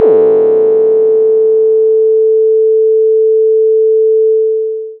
Instrument samples > Piano / Keyboard instruments
This is a4 with week resonace in piano diy